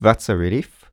Speech > Solo speech
Relief - Thats a relief 2
FR-AV2, Man, talk, Mid-20s, NPC, Male, Video-game, relief, Human, voice, U67, Neumann, oneshot, Vocal, singletake, Single-take, Tascam, Voice-acting, dialogue